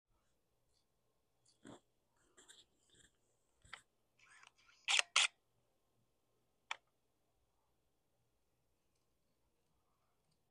Sound effects > Electronic / Design
A 2012 camera taking one photo.